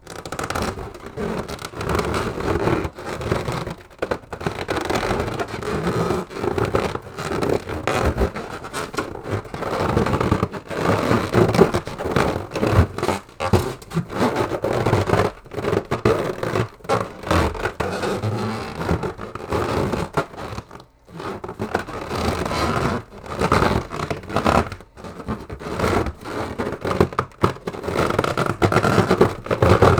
Sound effects > Objects / House appliances
RUBRFric-Blue Snowball Microphone, CU Balloon Stretching Nicholas Judy TDC
A rubber balloon stretching.
cartoon, Blue-Snowball, rubber, stretch, Blue-brand, balloon, foley